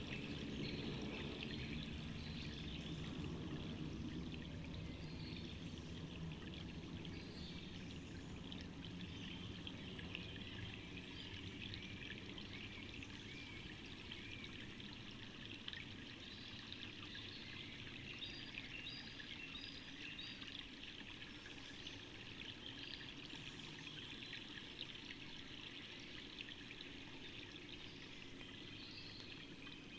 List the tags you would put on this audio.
Soundscapes > Nature
data-to-sound Dendrophone field-recording modified-soundscape natural-soundscape nature raspberry-pi sound-installation soundscape weather-data

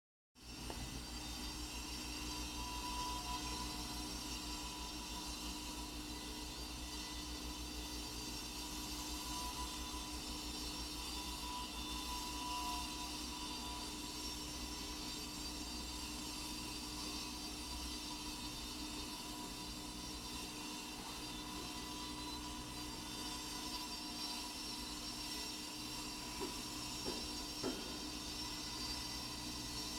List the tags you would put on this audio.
Soundscapes > Urban
clean construction urban outdoor work city power-saw early-morning building-site machinery street noise no-traffic metal-saw exterior cutting

